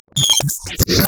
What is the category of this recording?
Sound effects > Experimental